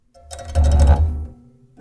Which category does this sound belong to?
Sound effects > Other mechanisms, engines, machines